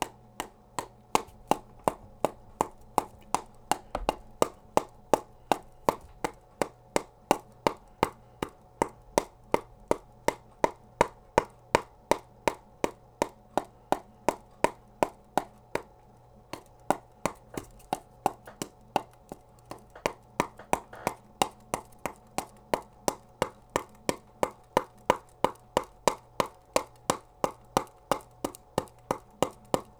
Sound effects > Objects / House appliances
TOYMisc-Blue Snowball Microphone, CU Paddleball, Being Played Nicholas Judy TDC
Someone playing with a paddleball.
Blue-brand, Blue-Snowball, foley, paddleball, play